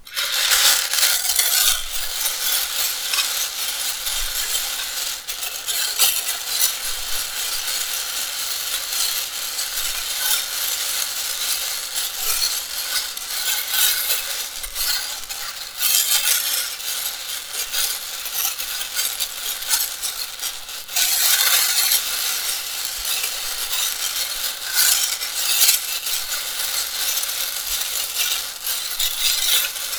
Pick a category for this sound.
Sound effects > Objects / House appliances